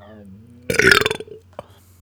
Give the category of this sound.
Sound effects > Other